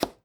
Sound effects > Other
Hard chop vegetable 4
Potato being cut with a santoku knife in a small kitchen.
Slice, Kitchen, Indoors, Chop, House, Knife, Chief, Household, Cut, Cook, Vegetable, Cooking